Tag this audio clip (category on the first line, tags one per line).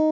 Instrument samples > String
stratocaster
arpeggio
design
cheap
guitar
tone
sound